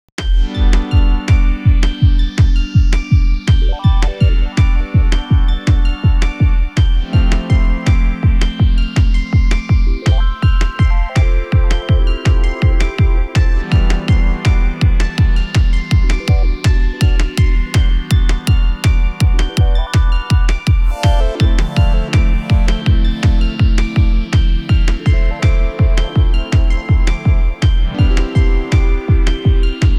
Music > Multiple instruments
Hyper Dream Beat Pad Key Melody Loop 165bpm
4 on floor ambient dream pads with a lil keys loop, created w FL studio, processed with the ol' Reaper
3over4, air, airy, ambient, boobs, clouds, Dream, dreampop, edm, electro, glitch, idm, industrial, loop, loopable, penis, pop, space, spacey, synth, synthy, techno, triphop